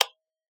Sound effects > Objects / House appliances
Cassetteplayer Button 1 Click
Pushing a button on a portable cassette player one shot sample, recorded with an AKG C414 XLII microphone.